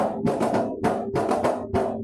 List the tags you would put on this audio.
Sound effects > Objects / House appliances
beat beats Drum hit hits metal plastic-box spoon steel tupperware

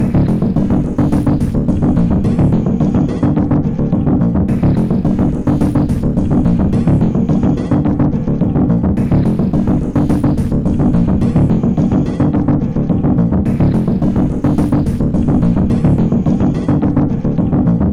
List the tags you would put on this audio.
Instrument samples > Synths / Electronic
Loopable Soundtrack Drum Loop Alien Samples Industrial Packs Weird Ambient Underground Dark

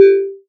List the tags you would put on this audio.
Instrument samples > Synths / Electronic
fm-synthesis bass additive-synthesis

